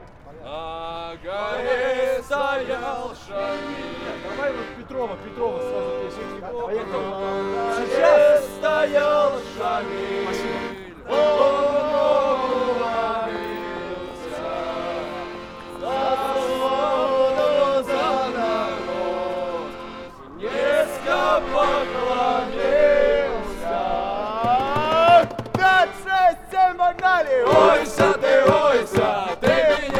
Music > Multiple instruments
Students singing in Moscow (Russia)
Recorded in Moscow. 2015. With recorder Sony PCM D50 <3.
russia, sing, russian-speech, trainstation, moscow